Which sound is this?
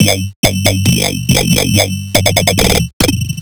Music > Solo instrument
Dubstep Yoy 2
Made using filters, slew distortion, and bitcrushing.
bass, dubstep, electro, electronic, growl, loop, synth, wobble, wub, yoy